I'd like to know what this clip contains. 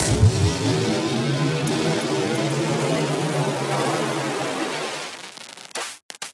Sound effects > Experimental
destroyed glitchy impact fx -003
a collection of glitch percussion sfx made using a myriad of software vsts and programs such as reaper, fl studio, zynaptiq, minimal audio, cableguys, denise biteharder, and more
hiphop, lazer, perc